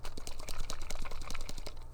Sound effects > Objects / House appliances
TOYMisc-Blue Snowball Microphone Magic 8 Ball, Shake 06 Nicholas Judy TDC
Shaking a magic 8-ball.